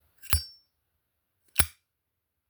Sound effects > Objects / House appliances
Zippo lighter open & close
Zippo lighter opening and closing
close, lighter, open, Zippo